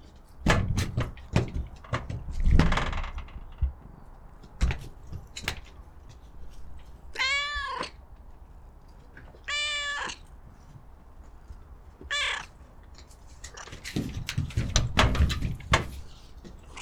Sound effects > Animals
ANMLCat 32bF Cat on a Tin Roof walk and Meow
Cat walking on to of a tin shed with meow. Recorded with a Zoom H6.